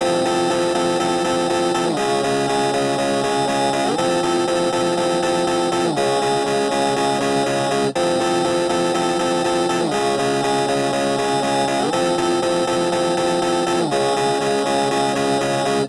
Music > Solo instrument
Guitar loops 124 12 verison 12 120.8 bpm
Otherwise, it is well usable up to 4/4 120.8 bpm.
8, 8-bit, bpm, electricguitar, guitar